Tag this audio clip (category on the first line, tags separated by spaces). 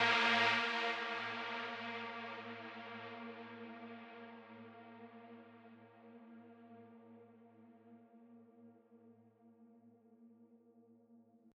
Music > Other
145bpm audacity flstudio notch oldphone T-Force-Alpha-Plus